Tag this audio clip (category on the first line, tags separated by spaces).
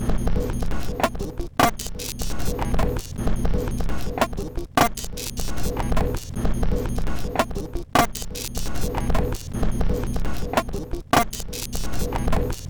Sound effects > Experimental
Ambient Drum Loopable Underground Weird